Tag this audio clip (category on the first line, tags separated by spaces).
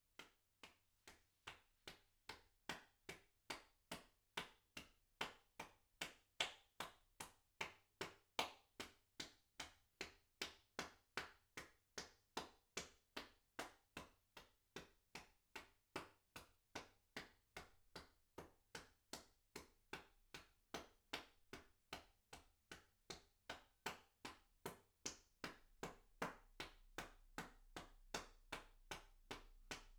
Sound effects > Human sounds and actions
Applause FR-AV2 Rode Solo-crowd Applaud indoor XY person individual clapping solo NT5 Applauding AV2 Tascam clap